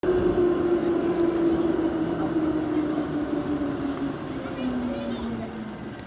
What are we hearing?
Soundscapes > Urban
Tram moving near station